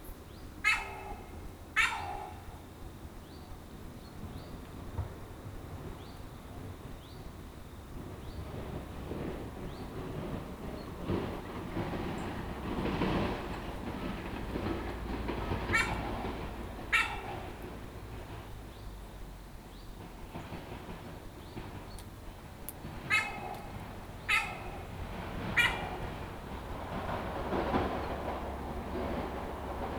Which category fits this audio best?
Sound effects > Animals